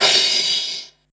Instrument samples > Percussion
Hyperrealism V9 Crash
synthetic drums processed to sound naturalistic